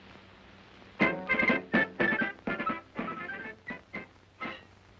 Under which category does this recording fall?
Music > Multiple instruments